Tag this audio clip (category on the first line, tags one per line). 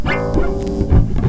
Instrument samples > String
slide
bass
electric
plucked
rock
fx
loops
mellow
riffs
loop
pluck
blues
charvel
funk
oneshots